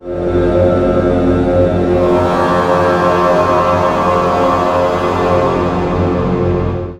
Instrument samples > Synths / Electronic
Drone fast, a nice drone sound speeded up for any use, just give us a credit thanks